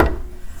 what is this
Sound effects > Other mechanisms, engines, machines
metal shop foley -003
boom, sound, fx, perc, tink, percussion, foley, knock, strike, bop, sfx, rustle, thud, bam, wood, pop, bang, crackle, shop, oneshot, metal, little, tools